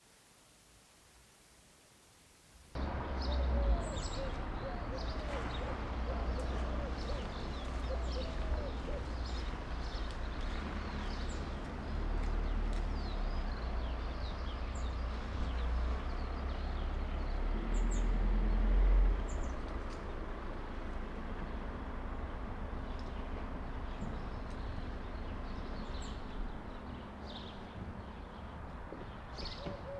Soundscapes > Nature
Calm subberbs, Poland. Zoom H5 Studio doesn't have dedicated windscreen yet so it's not the best recording but it has some good moments. You can find some airplane here (#3:30) or fast motorbike in distant (from #12:55 to #14:32)